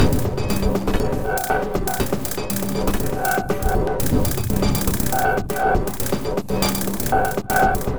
Instrument samples > Percussion
This 240bpm Drum Loop is good for composing Industrial/Electronic/Ambient songs or using as soundtrack to a sci-fi/suspense/horror indie game or short film.
Weird, Packs, Ambient, Industrial, Underground, Samples, Loopable, Dark, Drum, Alien, Soundtrack, Loop